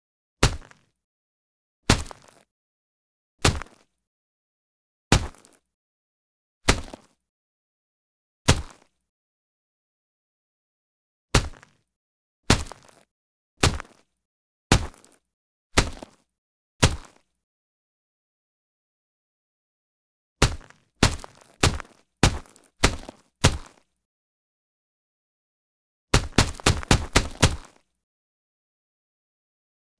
Sound effects > Animals
small raptor or creature like footstep sounds 06012025
custom sounds of raptor footsteps. can be used for small creatures, zombies or aliens.